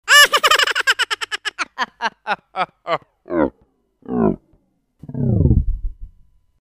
Sound effects > Human sounds and actions
Amazing Laughter - Risa increíble
Amazing laugh with dramatic tone changes. Una risa sorprendente con exagerados cambios de tono de altura del sonido.
carcajada, fantasylaughter, hightolowtones, laugther, risa